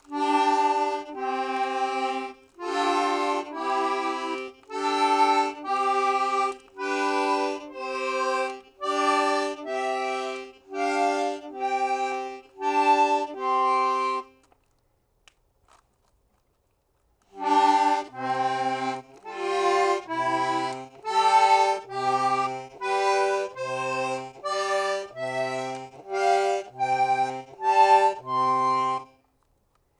Music > Solo instrument
MUSCInst-Blue Snowball Microphone, CU Accordion, Scales Nicholas Judy TDC
Blue-brand, note, scale